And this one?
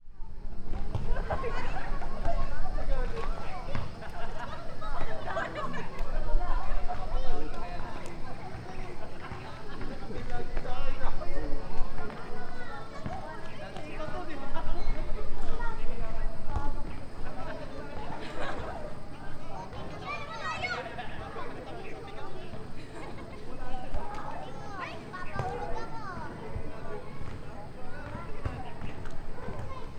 Soundscapes > Urban
250731 175435 PH Lively afternoon in Filipino suburb
Lively afternoon in a Filipino suburbs. I made this recording during a pleasant afternoon, from the terrace of a house located at Santa Monica Heights, which is a costal residential area near Calapan city (oriental Mindoro, Philippines). One can hear kids and teen-agers playing ball on the playground of the subdivision. In the background, some cicadas, birds chirping, and on the right side of the scene, people chanting for the Holy Mass held in the nearby seminary. On the left, one can also hear an entertainer talking in a microphone to comment a basket-ball contest taking place further. From time to time, few vehicles (mostly motorcycles) pass by. At #5:05, the bell from the nearby church starts ringing. And at #8:10, one can hear the fog horn of a ship leaving the harbour of the city. Recorded in July 2025 with a Zoom H6essential (built-in XY microphones). Fade in/out applied in Audacity.